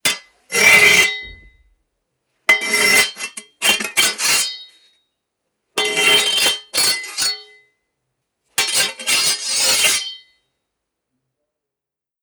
Sound effects > Other
Fight sword (rubbing)
The sound of two metal weapons clashing, rubbing and scraping against each other, made with a sickle and a metal blade tool. The sound evokes medieval swords or other weapons, perfect for foley in a fantasy movie or for video games battle. This sound is a heavier metal version. There is a lighter one in the pack with a higher pitch. (Unfortunately) recorded in mono with an iPhone (because I had nothing else available), but under ideal conditions. And then processed with RX11 and a few plugin adjustements.